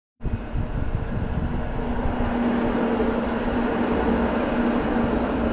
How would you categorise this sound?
Sound effects > Vehicles